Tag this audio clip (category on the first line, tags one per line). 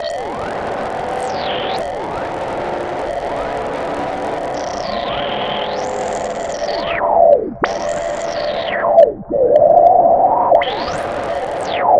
Sound effects > Electronic / Design
dark-techno
PPG-Wave
noise
cinematic
horror
vst
sound-design
science-fiction
dark-design
sci-fi